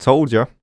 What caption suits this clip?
Speech > Solo speech
Subject : A mid20s male voice-acting for the first time. Check out the pack for more sounds. Objective was to do a generic NPC pack. Weather : Processing : Trimmed and Normalized in Audacity, Faded in/out. Notes : I think there’s a “gate” like effect, which comes directly from the microphone. Things seem to “pop” in. Also sorry my voice-acting isn’t top notch, I’m a little monotone but hey, better than nothing. I will try to do better and more pronounced voice acting next time ;) Tips : Check out the pack!